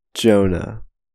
Speech > Solo speech
Male voice saying the name "Jonah." I recorded this with a zoom audio recorder.